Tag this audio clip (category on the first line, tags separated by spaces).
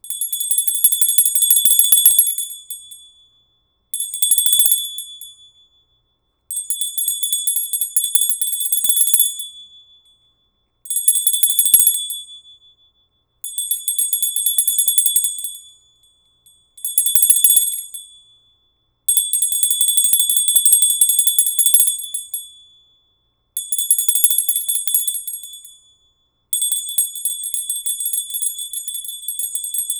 Sound effects > Objects / House appliances
Blue-Snowball bell Blue-brand handbell small metal ring hand